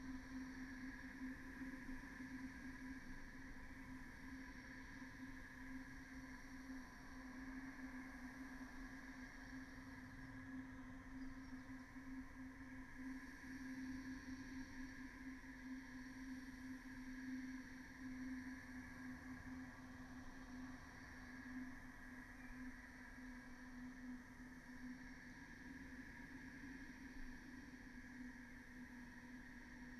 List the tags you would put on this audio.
Soundscapes > Nature
data-to-sound Dendrophone modified-soundscape soundscape alice-holt-forest artistic-intervention natural-soundscape raspberry-pi phenological-recording field-recording weather-data sound-installation nature

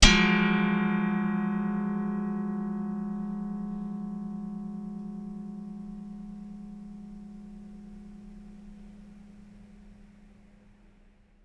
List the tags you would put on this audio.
Sound effects > Objects / House appliances
banging impact violin

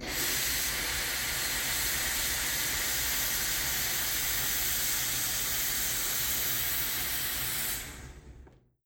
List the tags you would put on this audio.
Sound effects > Other mechanisms, engines, machines
hiss Phone-recording steam steamcleaner wagner

